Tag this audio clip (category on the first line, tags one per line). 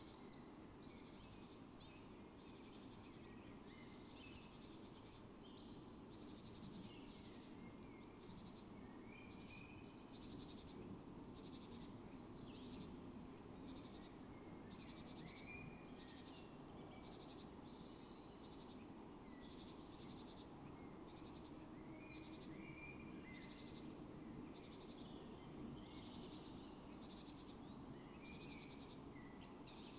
Soundscapes > Nature
modified-soundscape
sound-installation
field-recording
weather-data
raspberry-pi
artistic-intervention
phenological-recording
nature
data-to-sound
soundscape
natural-soundscape
Dendrophone
alice-holt-forest